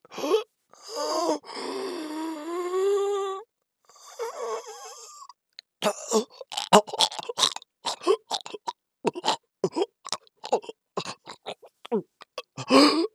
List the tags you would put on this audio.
Speech > Solo speech
agony death breatheless war battle Helples

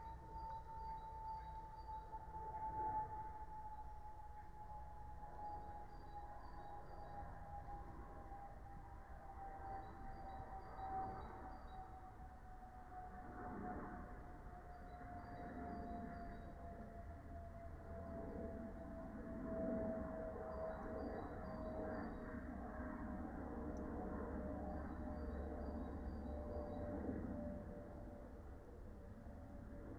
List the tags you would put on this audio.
Soundscapes > Nature
nature meadow field-recording raspberry-pi phenological-recording alice-holt-forest natural-soundscape soundscape

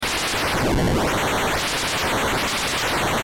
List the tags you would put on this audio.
Sound effects > Electronic / Design
Beepbox Chipnoise Glitch

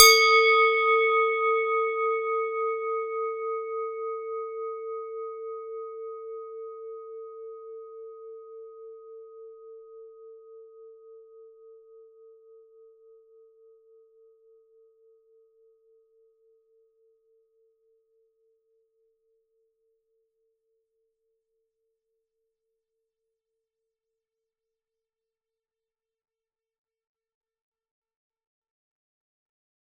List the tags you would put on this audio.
Instrument samples > Percussion
bell close-up cowbell ding FR-AV2 NT5 one-shot oneshot rim-mic Rode swiss-cowbell

